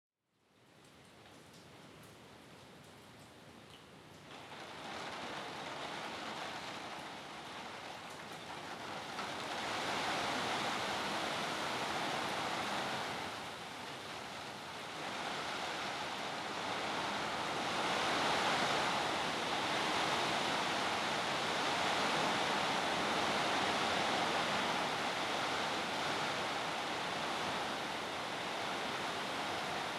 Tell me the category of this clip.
Soundscapes > Nature